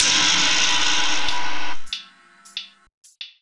Sound effects > Electronic / Design
Impact Percs with Bass and fx-009
looming, bash, brooding, foreboding, mulit, bass, hit, explode, impact, perc, sfx, low, explosion, percussion, deep, oneshot, crunch, smash, combination, ominous, theatrical, cinamatic, fx